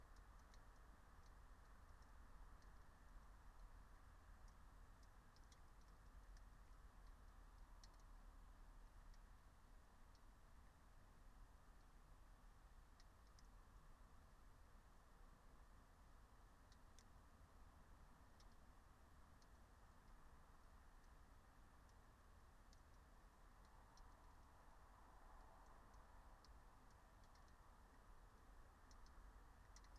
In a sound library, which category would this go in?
Soundscapes > Nature